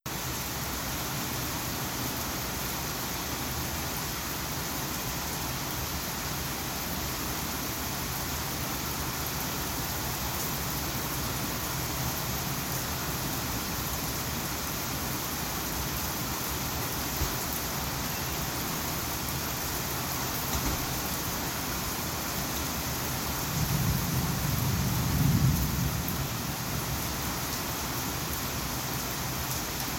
Soundscapes > Nature
STORM-Samsung Galaxy Smartphone, CU Rainshower, Thunder Rumble, Then Booms Nicholas Judy TDC
A rainshower with thunder rumbles, then one thunder boom.
rainshower, thunder, boom, rumble